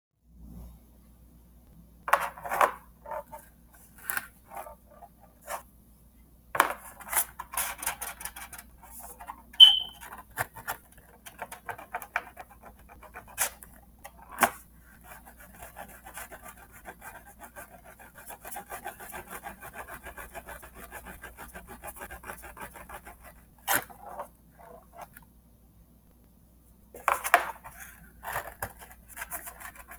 Sound effects > Objects / House appliances
Rubbing a Pencil against Domino's Pizza Box
I had no other cardboard object in the house so I got a pencil and my laptop and went downstairs to the kitchen and went up to the pizza and started rubbing the pencil against the outside of the lid of the Domino's Pizza Box which is slightly open (which the box is made out of cardboard and Domino's is the name of the brand that made the pizza and box) the pizza box was on the downstairs counter which is made out of granite i think
Dare2025-10, pizza